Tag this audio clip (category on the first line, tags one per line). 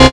Instrument samples > Synths / Electronic
additive-synthesis
bass
fm-synthesis